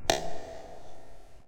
Sound effects > Experimental
Creature Monster Alien Vocal FX (part 2)-068
Alien, demon, dripping, otherworldly, snarl, zombie